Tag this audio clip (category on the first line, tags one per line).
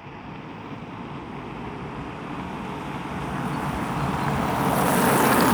Soundscapes > Urban
vehicle
Car
CarInTampere